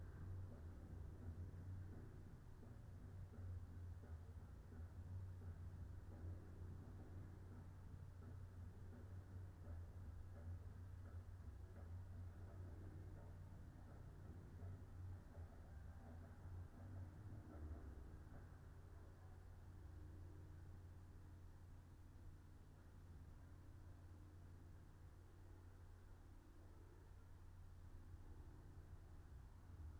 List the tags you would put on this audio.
Soundscapes > Nature
nature
soundscape
field-recording
natural-soundscape
phenological-recording
raspberry-pi
meadow
alice-holt-forest

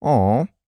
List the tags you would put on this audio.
Speech > Solo speech
Tascam
2025
Single-mic-mono
Sennheiser
july
dissapointed
Calm
MKE600
Hypercardioid
Shotgun-mic
Shotgun-microphone
Adult
MKE-600
aww

